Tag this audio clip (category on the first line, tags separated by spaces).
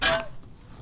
Sound effects > Other mechanisms, engines, machines
metal perc smack foley twangy twang percussion fx saw household tool handsaw plank vibe shop metallic hit sfx vibration